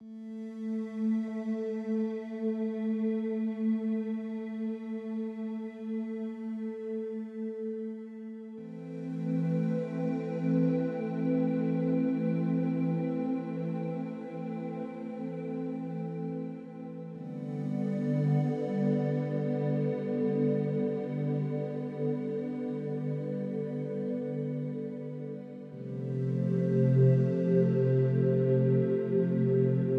Music > Solo instrument
Relaxation music #69
Relaxation music/ambiance for a variety of purposes. Failing to comply will result in your project, any type, being taken down.
atmosphere, soundscape, beautifull, ambience, soothing, lonely, electronic, ambient, single, track